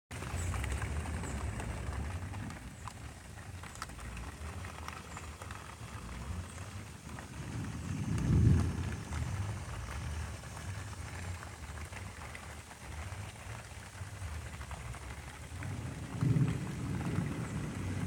Soundscapes > Nature
Rain pattering upon leaves. The occasional sound of thunder as well. Recorded in Ohio.